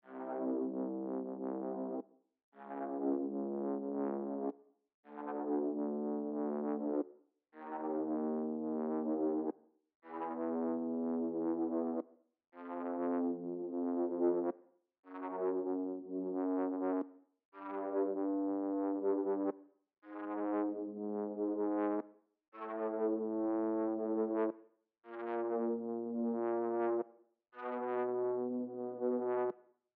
Music > Solo instrument
Pad made from UAD Opal synth
synth, pad